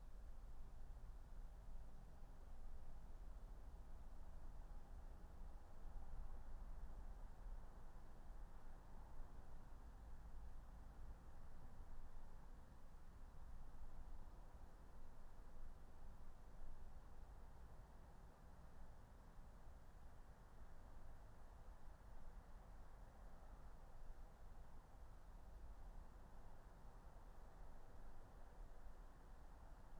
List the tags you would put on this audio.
Soundscapes > Nature
field-recording phenological-recording soundscape raspberry-pi natural-soundscape meadow alice-holt-forest nature

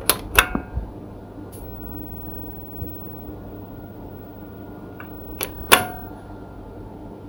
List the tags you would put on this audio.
Sound effects > Objects / House appliances
button crt monitor television tv

